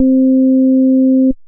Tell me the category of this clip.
Instrument samples > Synths / Electronic